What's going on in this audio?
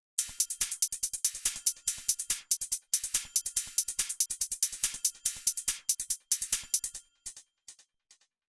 Music > Multiple instruments
Percussion Loop BPM142
Loop, Rythmn, Percussion